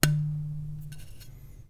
Music > Solo instrument
Marimba Loose Keys Notes Tones and Vibrations 25-001
block, foley, fx, keys, loose, marimba, notes, oneshotes, perc, percussion, rustle, thud, tink, wood, woodblock